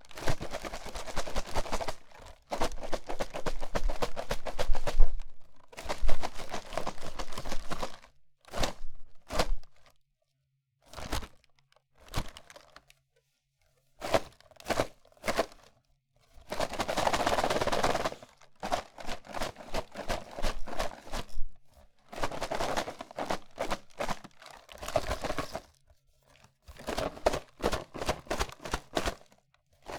Sound effects > Objects / House appliances
Cracker Box Shaking
A cardboard box of Triscuits being shaken in all sorts of ways. Great foley to mix into other SFX to imply movement.
box, candy, cardboard, cracker, crackers, food, plastic, rattle, rattling, shake, shaking, snack, snacks, triscuit